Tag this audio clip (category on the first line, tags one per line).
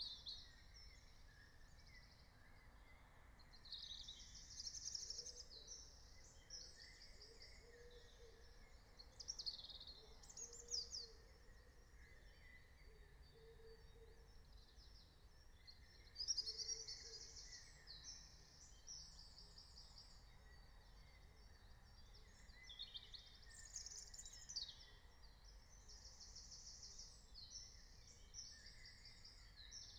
Soundscapes > Nature
field-recording
meadow
phenological-recording
soundscape
raspberry-pi
alice-holt-forest
natural-soundscape
nature